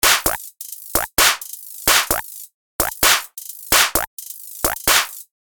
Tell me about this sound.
Music > Multiple instruments
SIMPLE BEAT THAT I MADE ON FL STUDIO
i don't have great composing skills, but i hope you enjoy it, you can use for free in your project